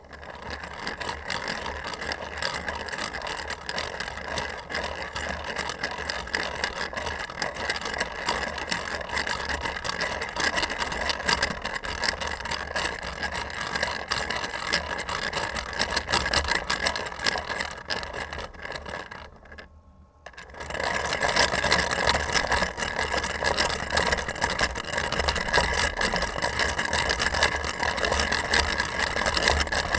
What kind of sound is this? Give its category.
Sound effects > Objects / House appliances